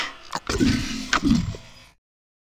Sound effects > Experimental
A collection of alien creature monster sounds made from my voice and some effects processing